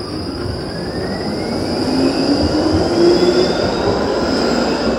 Sound effects > Vehicles
Tram arrival and departure sequences including door chimes and wheel squeal. Wet city acoustics with light rain and passing cars. Recorded at Sammonaukio (19:00-20:00) using iPhone 15 Pro onboard mics. No post-processing applied.
Tram, urban